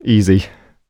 Speech > Solo speech
Cocky - Easy 2
FR-AV2, talk, sarcastic, cocky, Video-game, Man, voice, singletake, word, Male, Single-take, U67, Neumann, Tascam, NPC, Vocal, dialogue, Human, Voice-acting, Mid-20s, oneshot, smug